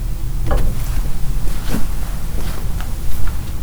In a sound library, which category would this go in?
Sound effects > Other mechanisms, engines, machines